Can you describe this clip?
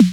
Instrument samples > Synths / Electronic
TR-606-mod-sn OneShot 02
Bass, Drum, Kit, Synth